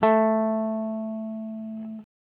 Instrument samples > String
Random guitar notes 001 A3 08
electric, electricguitar, guitar, stratocaster